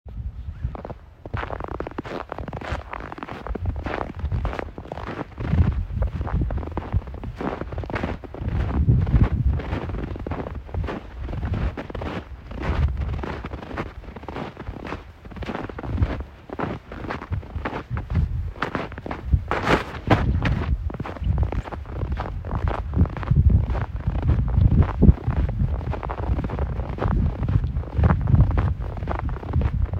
Soundscapes > Nature
snow walking
some footsteps sounds of me in the snow. recorded off my iPhone
cold, crunch, feet, foley, foot, footstep, footsteps, freeze, frost, ice, snow, snowy, step, steps, walk, walking, winter